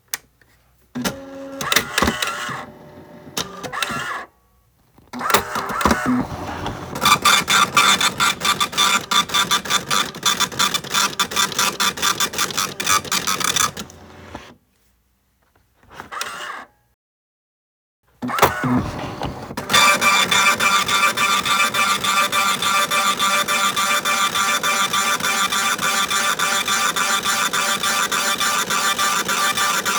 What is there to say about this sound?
Sound effects > Other mechanisms, engines, machines
Epson TM-H6000II slip printer 0
The slip printer on an Epson TM-H6000II performing its self-test. The slip printer is a narrow dot-matrix printer similar to those on some receipt printers (particularly those intended for kitchen use) but designed to work on sheets of paper rather than rolls. At #0:00, power is switched on and the printer initializes itself. At #0:05, the printer receives one sheet and prints the current settings onto the sheet. Because the printer is printing a varied pattern of characters and spaces, the sound is irregular. At #0:16, the printer responds to the sheet of paper being removed from the mechanism. At #0:17, the printer is fed another sheet and prints a test pattern that has a character in every position, resulting in a much more uniform buzzing noise. At #0:40, the printer responds to the sheet of paper being removed from the mechanism. At #0:42, the printer is fed another sheet and continues printing its test pattern.